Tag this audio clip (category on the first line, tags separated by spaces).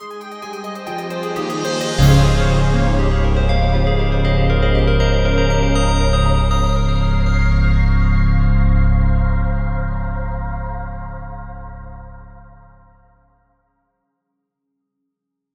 Music > Multiple instruments
victory video-game dylan-kelk video-game-mission-complete rpg discover-location mission-complete find-item rpg-video-game discovery find-key quest-complete fanfare video-game-level-up level-up get-item triumph cinematic-hit triumphant